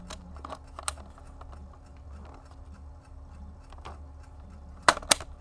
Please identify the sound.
Sound effects > Objects / House appliances
COMCam-Blue Snowball Microphone, CU Canon DL 9000, Film Compartment, Open, Close Nicholas Judy TDC
A Canon DL-9000 film compartment opening and closing.
film-compartment, close, foley, Blue-Snowball